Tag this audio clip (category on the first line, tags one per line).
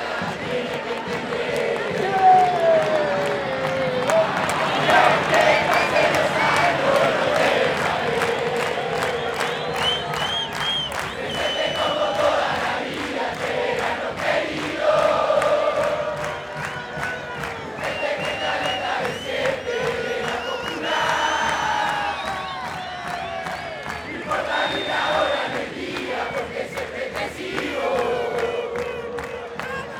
Soundscapes > Urban
ALBERDI
BELGRANO
CAB
CELESTE
CLUB-ATLETICOBELGRANO
CORDOBA
FOOTBALL
FUTBOL
PIRATAS
PIRATE
PIRATES-OF-ALBERDI
PUBLIC
SOCCER
STADIUM